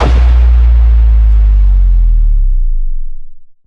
Sound effects > Electronic / Design
RESYNTH IMPACT TWO X
A custom cinematic impact using a sine wave for added LFE value, engineered from pure synthesis, resynthesis, and standard sound design techniques. Designed for high-intensity transitions, trailers, and sound design projects.